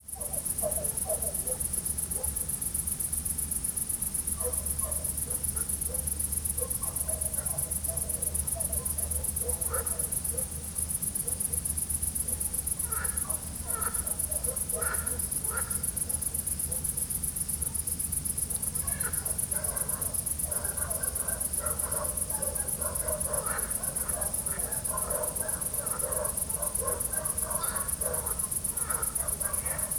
Soundscapes > Nature
summer night in willow plantation
Summer night in a willow plantation next to a wetland. Fogs, dogs, crickets, animals noises and road faraway.